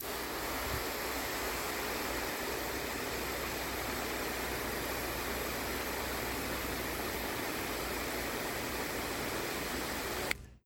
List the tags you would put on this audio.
Sound effects > Objects / House appliances
water Phone-recording flat hose spray

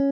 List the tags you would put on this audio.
Instrument samples > String
tone stratocaster design guitar sound arpeggio cheap

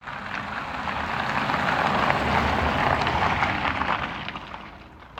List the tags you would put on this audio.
Sound effects > Vehicles
driving vehicle electric

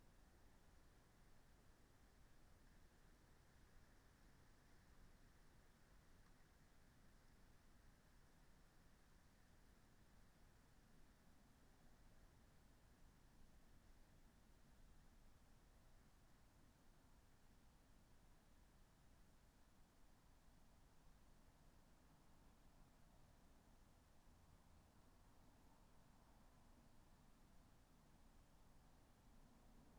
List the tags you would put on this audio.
Soundscapes > Nature
alice-holt-forest artistic-intervention

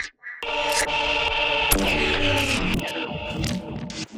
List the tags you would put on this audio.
Sound effects > Experimental
zap idm perc glitch laser clap percussion pop

